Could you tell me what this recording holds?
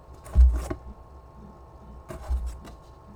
Sound effects > Objects / House appliances
OBJCont-Blue Snowball Microphone, CU Box, Cardboard, Place Item, Remove Nicholas Judy TDC
Placing an item in and removing from cardboard box.
place, cardboard, box, Blue-brand, remove, item, Blue-Snowball, foley